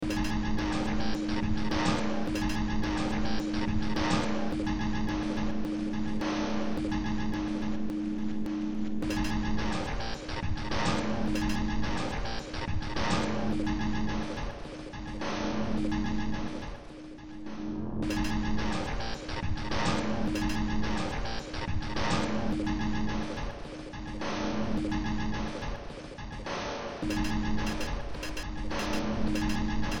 Music > Multiple instruments
Short Track #3868 (Industraumatic)
Ambient, Cyberpunk, Games, Horror, Industrial, Noise, Sci-fi, Soundtrack, Underground